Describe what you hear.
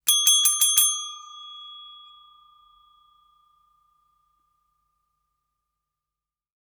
Sound effects > Objects / House appliances
Ring the receptionist's bell five times
Calling hotel staff by bell. Recorded in a recording studio. Please tell us how you plan to use this recording.
Bell, call, chime, counter, hall, hotel, meeting, motel, office, reception, service, tourism